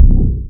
Instrument samples > Percussion
An attenuated bass aliendrum/weirddrum. I have a louder version.